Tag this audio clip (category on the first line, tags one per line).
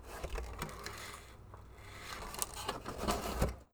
Sound effects > Objects / House appliances
Blue-brand
Blue-Snowball
box
close
foley
open
pizza
pizza-box